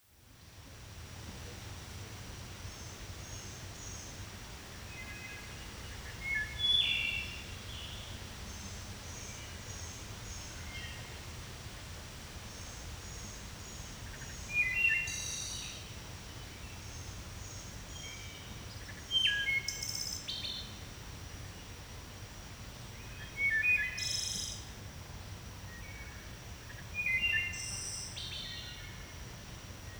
Soundscapes > Nature
This is the wonderful song of the Wood Thrush which arrives every Summer to the woods of Illinois to feast on the plentiful insects and raise a family. They are very, VERY elusive and will not let you easily see them. But, their beautiful song, a soundscape of summer, more than makes up for their shyness. This Wood Thrush, fortunately for me, was staying in one place in some deep, moist woods bordering a small lake so all I had to do was set up my recording equipment and enjoy the show. Hope you enjoy this soundscape of one of the most melodic bird-song in North and Central America. Recorded June 3, 2025. Equipment Sound Devices MixPre 3 ii recorder Sennheiser MKH 416 microphone